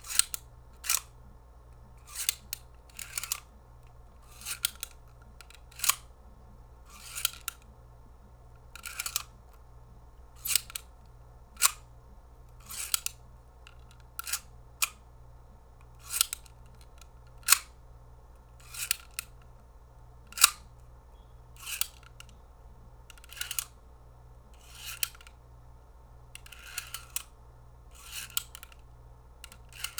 Sound effects > Objects / House appliances
FOLYProp-Blue Snowball Microphone PEZ Candy Dispenser Nicholas Judy TDC
A PEZ candy dispenser.
Blue-brand, dispenser, candy, Blue-Snowball, foley, pez